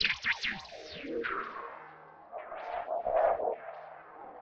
Soundscapes > Synthetic / Artificial
LFO Birdsong 29
lfo, massive